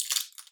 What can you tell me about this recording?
Instrument samples > Percussion
Cellotape Percussion One Shot15
pack, layering, shot, ambient, adhesive, lo-fi, sound, cellotape, design, foley, drum, cinematic, organic, samples, texture, shots, electronic, experimental, sounds, DIY, creative, found, glitch, IDM, one, unique, percussion, sample, tape